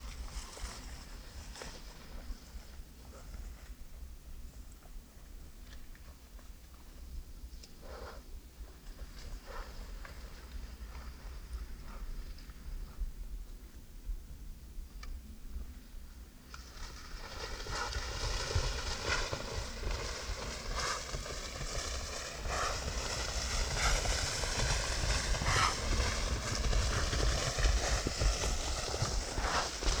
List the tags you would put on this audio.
Soundscapes > Nature
ambiance ambience boar boars britany countryside field-recording france grass nature night summer

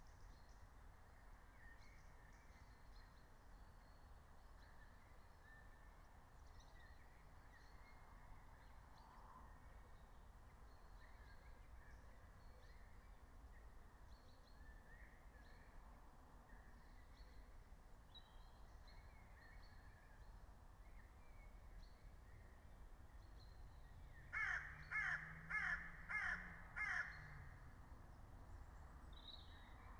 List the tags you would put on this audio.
Soundscapes > Nature
nature natural-soundscape phenological-recording alice-holt-forest meadow raspberry-pi field-recording soundscape

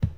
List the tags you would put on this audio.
Sound effects > Objects / House appliances
bucket carry clang clatter cleaning container debris drop fill foley garden handle hollow household kitchen knock lid liquid metal object pail plastic pour scoop shake slam spill tip tool water

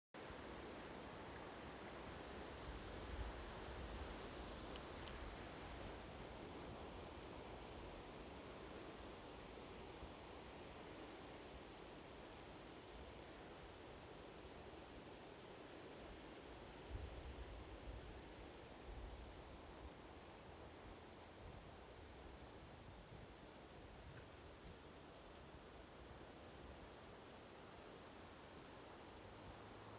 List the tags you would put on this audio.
Soundscapes > Nature
Mildura soundscape Wind

